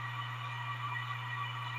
Sound effects > Other mechanisms, engines, machines
1TB Seagate HDD Idle Sound
The sound of my 1 TB of Seagate HDD while at idle state. Recorded from my phone TECNO SPARK 20C. (The reason why the sound is short, is because I didn't had space to record more on my phone)
drive, hard